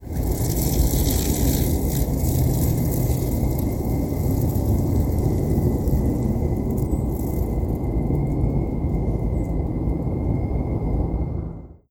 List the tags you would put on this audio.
Sound effects > Natural elements and explosions
watering-can Phone-recording plants

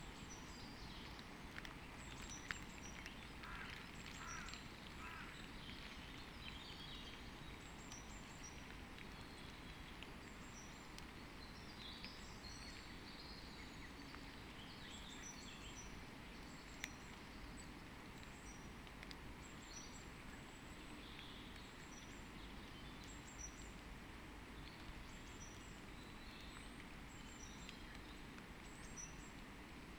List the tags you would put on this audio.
Soundscapes > Nature
artistic-intervention data-to-sound field-recording natural-soundscape nature sound-installation soundscape weather-data

